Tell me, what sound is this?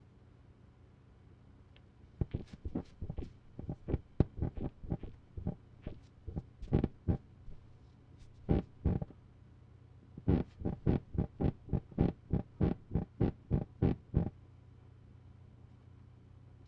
Sound effects > Other
floor creak 3
Some floor creaks to practice my recording and editing skills on. Recorded on a Shure MV6 microphone.
floor, floorboard